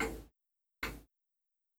Sound effects > Other mechanisms, engines, machines
CLOCKTick-Samsung Galaxy Smartphone, CU Grandfather Clock, Looped Nicholas Judy TDC
A grandfather clock ticking. Looped.
clock, tick, Phone-recording, grandfather-clock, loop, grandfather